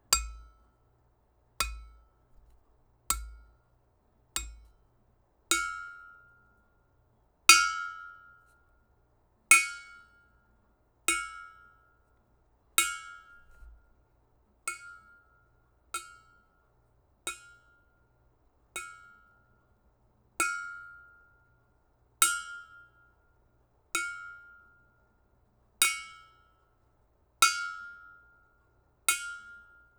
Sound effects > Objects / House appliances
High pitched metal impacts.